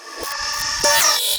Sound effects > Experimental
Gritch Glitch snippets FX PERKZ-007
alien, glitchy, edm, percussion, impacts, whizz, idm, snap, fx, experimental, clap, impact, pop, sfx, laser, zap, glitch, lazer, crack, otherworldy, perc, hiphop, abstract